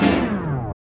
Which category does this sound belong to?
Sound effects > Electronic / Design